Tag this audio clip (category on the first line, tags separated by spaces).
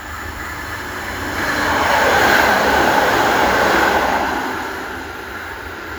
Soundscapes > Urban
Tram Drive-by field-recording